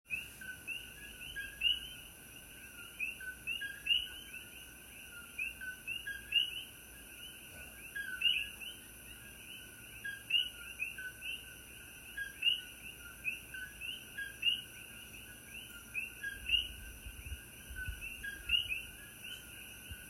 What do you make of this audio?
Soundscapes > Nature
Sitting on the back deck in the big island of Hawaii recording the night sounds. Recorded on my phone.